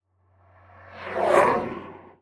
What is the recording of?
Sound effects > Electronic / Design
JET WHOOSH DRY
Sound, swoosh, synth, whip, whoosh